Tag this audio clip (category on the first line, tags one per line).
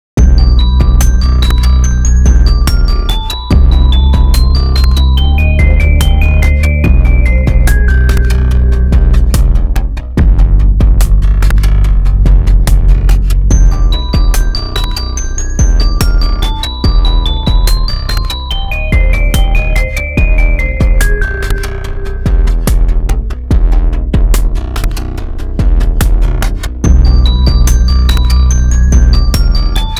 Music > Multiple instruments
bass
beat
chill
dark
downtempo
hip
hiphop
hop
loop
melodic
melody
percussion